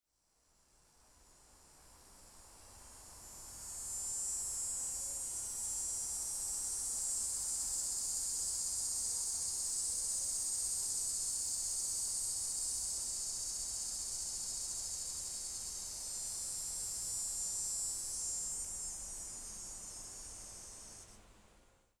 Soundscapes > Nature
Cicadas Close
Field Recording of some close cicadas
Bug, Natural, Recording, Nature, Day, Cicada